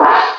Instrument samples > Percussion
VERY GOOD FOR MUSIC AS A SECONDARY WEIRD GONGCRASH! It's attackless. Use a ride as its attack. IT'S NOT A GONG! It's a bassless sample to be used in music.